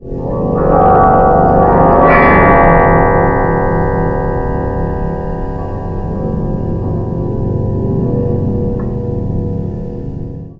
Soundscapes > Other
Text-AeoBert-Pad-04
strings, storm, swells, dischordant, wind